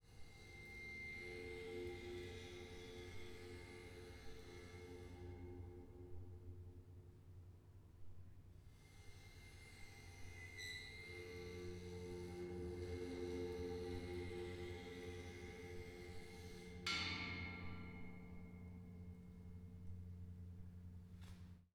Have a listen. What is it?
Sound effects > Other
Bowing metal stairs with cello bow 5
atmospheric, eerie, effect, fx, horror